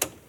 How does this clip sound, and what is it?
Sound effects > Other mechanisms, engines, machines
A switch being... switched (wow, no way) Recorded with my phone.
button, press